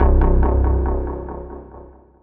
Instrument samples > Synths / Electronic
CVLT BASS 115
bass, lfo, subs, clear, synth, bassdrop, wavetable, subwoofer, wobble, subbass, stabs, sub, drops, lowend, low, synthbass